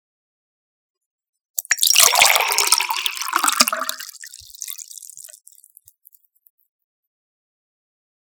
Sound effects > Objects / House appliances
pouring-water-into-glass
Liquid splashing into a glass. Recorded with Zoom H6 and SGH-6 Shotgun mic capsule.
glass, kitchen, pour, water